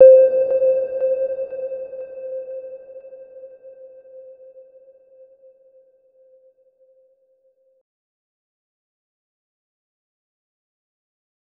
Sound effects > Electronic / Design
Blip Water Drop One Shot with Reverb
A single one-shot water drop with reverb Sample is from a water drop recorded with Tascam Portacapture X6
blip, one-shot, reverb